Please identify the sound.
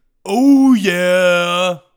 Speech > Solo speech
oh yeah
english, music, producer, speech, tags, voice